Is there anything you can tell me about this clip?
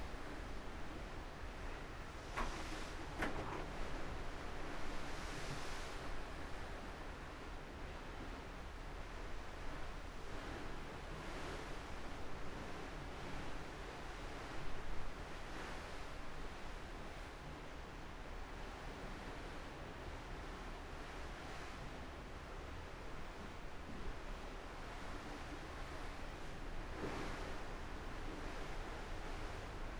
Soundscapes > Other
Helicopter leaving ship and message from captain
The Netherlands Coastguard helicopter leaving the Sky Princess cruise ship after picking up a patient for a medical emergency. About 2 minutes in you can hear the captains announcement over the tannoy.
coast, coastguard, emergency, evacuation, helicopter, medical, north, ocean, sea, ship